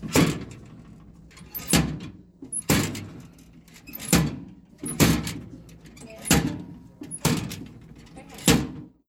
Sound effects > Objects / House appliances
DOORCab-Samsung Galaxy Smartphone, CU Metal Mailbox, Open, Close 02 Nicholas Judy TDC
A metal mailbox opening and closing. Recorded at Lowe's.
foley, metal, mailbox, Phone-recording, open, close